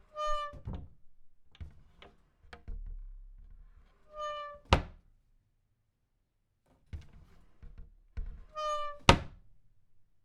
Sound effects > Objects / House appliances
Small plate cabinet door - lower hinge recording
Subject : In door of a cabinet. Where we put our plates :) Date YMD : 2025 04 Location : Indoor Gergueil France Hardware : Tascam FR-AV2 and a Rode NT5 microphone. Weather : Processing : Trimmed and Normalized in Audacity. Maybe with a fade in and out? Should be in the metadata if there is.